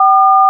Sound effects > Electronic / Design
This is the number 4 in DTMF This is also apart of the pack 'DTMF tones 0-9'
dtmf, retro, telephone